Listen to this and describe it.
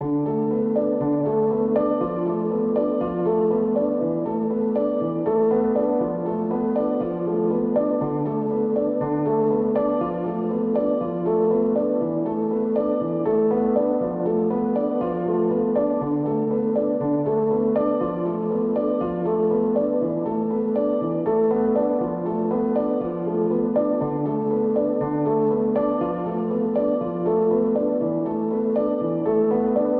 Music > Solo instrument
Piano loops 053 efect 4 octave long loop 120 bpm
120bpm, music